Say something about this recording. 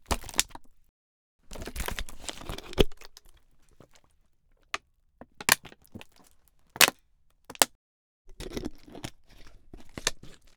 Sound effects > Other
plastic water bottle, handle, open, drink from, close
A single long take of opening and drinking from a plastic water bottle.
bottle, dri, plastic, water, water-bottle